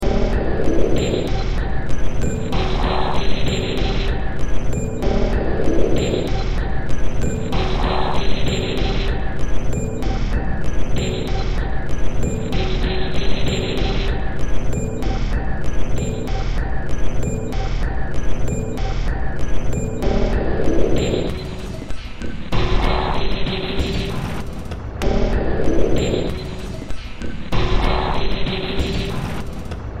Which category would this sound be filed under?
Music > Multiple instruments